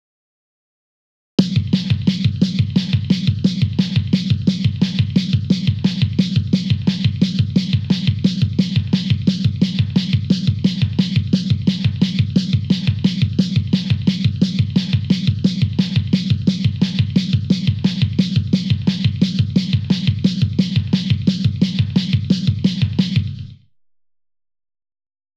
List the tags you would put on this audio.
Music > Solo percussion
Bass-and-Snare Experimental Experimental-Production Experiments-on-Drum-Patterns Four-Over-Four-Pattern FX-Drum-Pattern FX-Drums FX-Laden Glitchy Interesting-Results Noisy Silly Simple-Drum-Pattern

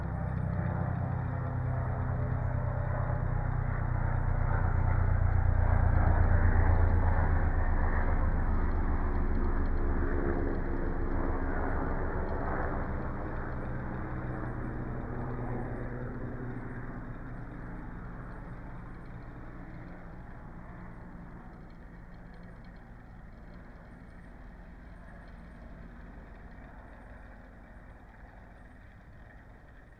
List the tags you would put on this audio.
Soundscapes > Nature
sound-installation phenological-recording weather-data nature natural-soundscape modified-soundscape field-recording data-to-sound raspberry-pi alice-holt-forest Dendrophone artistic-intervention soundscape

